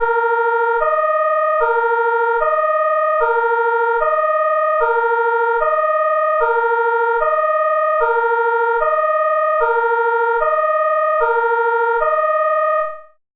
Sound effects > Vehicles
The sound of a German Martinshorn (Emergency vehicle siren) full synthized in FL-Studio using the default "3x Osc"-VST.